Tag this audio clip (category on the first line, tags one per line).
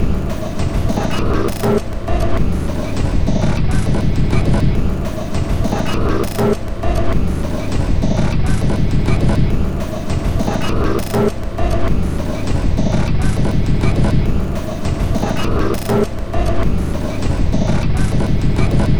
Instrument samples > Percussion
Weird
Samples
Underground
Loopable
Industrial